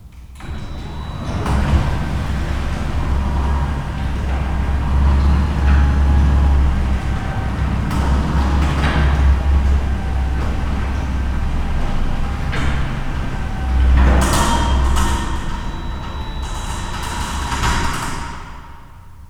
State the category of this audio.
Sound effects > Other